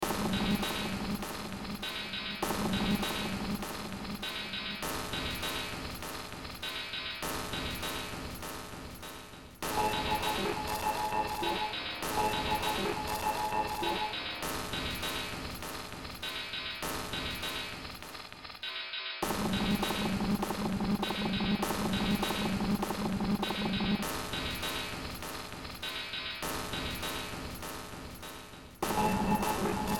Music > Multiple instruments
Short Track #3855 (Industraumatic)
Cyberpunk, Soundtrack